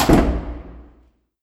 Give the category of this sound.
Sound effects > Objects / House appliances